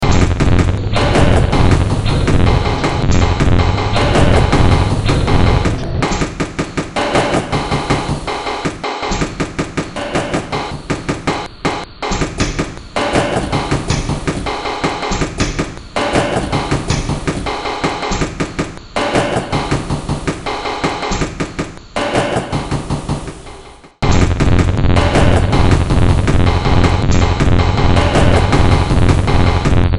Music > Multiple instruments
Short Track #3378 (Industraumatic)
Ambient, Cyberpunk, Games, Horror, Industrial, Noise, Sci-fi, Soundtrack, Underground